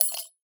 Sound effects > Objects / House appliances
Jewellerybox Shake 6 SFX
Shaking an open ceramic jewellery container with the contents inside, recorded with an AKG C414 XLII microphone.
jewellery, jewellery-box